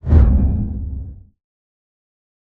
Sound effects > Other
Sound Design Elements Whoosh SFX 016
ambient, audio, cinematic, design, dynamic, effect, effects, element, elements, fast, film, fx, motion, movement, production, sound, sweeping, swoosh, trailer, transition, whoosh